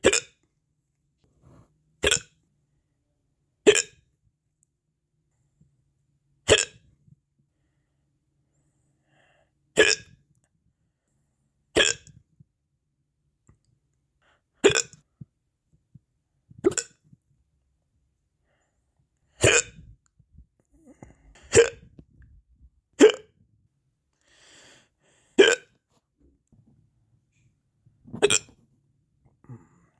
Sound effects > Human sounds and actions
HMNCough-Samsung Galaxy Smartphone Hiccups Nicholas Judy TDC
human, hiccup